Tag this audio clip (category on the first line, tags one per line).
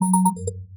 Sound effects > Electronic / Design
button
Interface
alert
notification
Digital
UI
menu
options
message